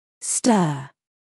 Speech > Solo speech
to stir
english, pronunciation, voice, word